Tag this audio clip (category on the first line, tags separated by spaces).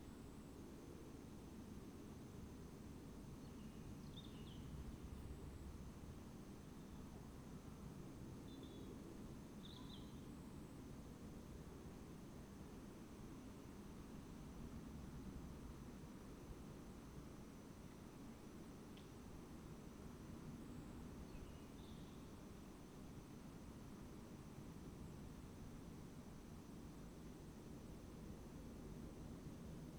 Soundscapes > Nature
data-to-sound soundscape sound-installation field-recording raspberry-pi weather-data alice-holt-forest Dendrophone modified-soundscape phenological-recording artistic-intervention natural-soundscape nature